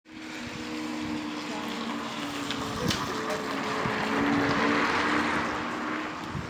Soundscapes > Urban
7 févr., 10.31 voiture qui passe
cars passing by